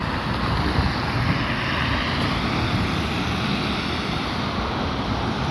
Soundscapes > Urban
cars driving past in rain